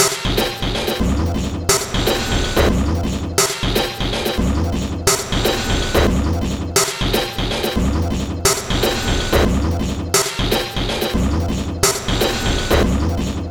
Instrument samples > Percussion
This 142bpm Drum Loop is good for composing Industrial/Electronic/Ambient songs or using as soundtrack to a sci-fi/suspense/horror indie game or short film.
Ambient, Dark, Drum, Industrial, Loop, Loopable, Packs, Samples, Soundtrack, Underground, Weird